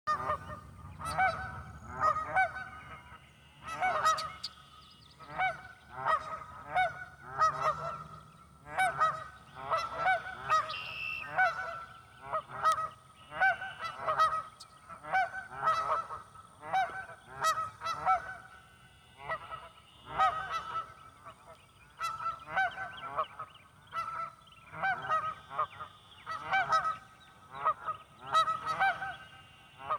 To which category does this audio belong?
Sound effects > Animals